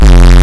Instrument samples > Synths / Electronic
Hardstyle Rumble Bass 1
Sample used ''SFX Chunk Thud'' from Flstudio original sample pack. Processed with Fruity Limiter, Plasma. I think you can use it to make some PVC Kicks.
Bass, Distorted, Hardstyle, PvcKick, Rawstyle, Rumble